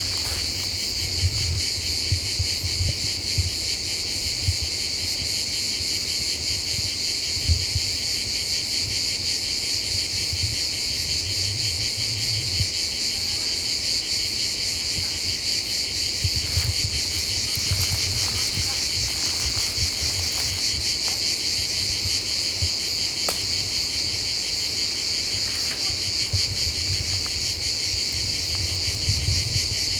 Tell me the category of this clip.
Soundscapes > Nature